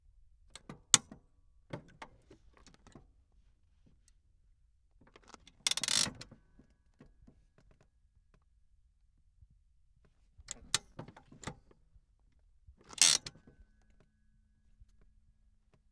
Sound effects > Vehicles
Ford 115 T350 - Handbreak
Old, T350, SM57, France